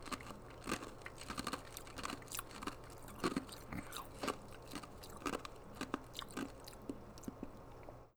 Sound effects > Human sounds and actions
FOODEat-Blue Snowball Microphone Ice Cubes Nicholas Judy TDC
Someone eating ice cubes.